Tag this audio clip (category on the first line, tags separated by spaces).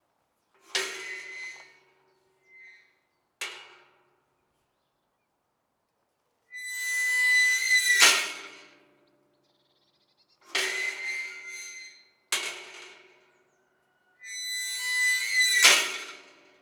Sound effects > Objects / House appliances
Dare2025-06A squeeky gate metal-sound squeaky-gate squeaky squeek metal-gate squeak